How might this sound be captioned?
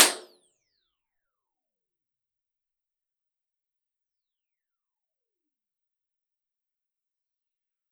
Sound effects > Other
Garage bathroom impulse response
Impulse response of the bathroom in my garage. Small, bare brick walls and tile floor.
convolution, ir, reverberation